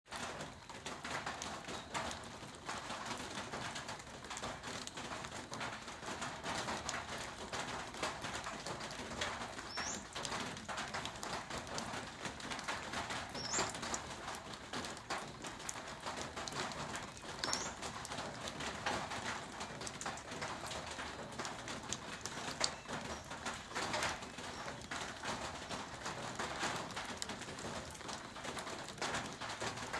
Soundscapes > Nature
Drizzle drips from awning morning ambience 07/05/2022
country, drips, drizzle, drops, farmlife, field-recordings, water